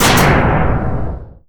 Sound effects > Vehicles
Booming collision edit.